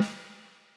Music > Solo percussion
Snare Processed - Oneshot 184 - 14 by 6.5 inch Brass Ludwig
snare drum 14 by 6.5 inch brass ludwig recorded in the soundproofed sudio of Calupoly Humboldt with an sm57 and a beta 58 microphone into logic and processed lightly with Reaper
crack, beat, realdrums, snareroll, kit, oneshot, drumkit, realdrum, flam, sfx, processed, hits, rim, fx, drum, acoustic, drums, snare, snares, percussion, hit, brass, snaredrum, rimshot, reverb, perc, ludwig, rimshots, roll